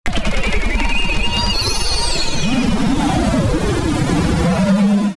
Sound effects > Electronic / Design
Optical Theremin 6 Osc Destroyed-011
Analog, Electronic, Glitch, Instrument, Otherworldly, Robotic, Scifi, Sweep, Theremin